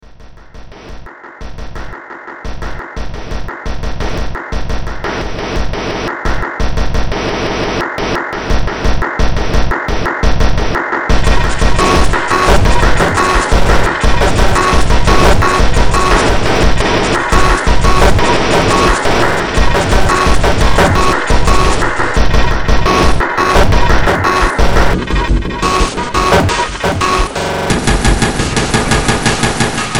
Music > Multiple instruments
Demo Track #3877 (Industraumatic)
Ambient, Cyberpunk, Games, Horror, Industrial, Noise, Sci-fi, Soundtrack, Underground